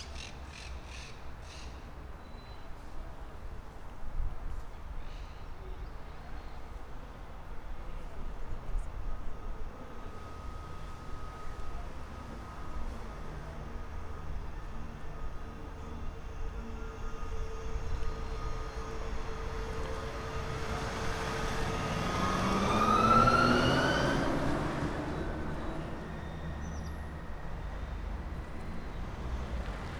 Soundscapes > Urban
20250312 JardinsMontbau Traffic Annoying
Montbau, Traffic, Annoying